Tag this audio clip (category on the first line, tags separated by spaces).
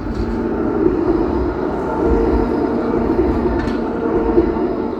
Sound effects > Vehicles
transportation vehicle